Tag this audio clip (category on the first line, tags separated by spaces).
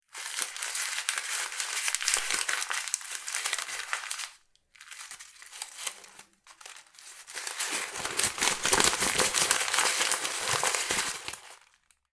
Sound effects > Objects / House appliances
paper foley original texture crumple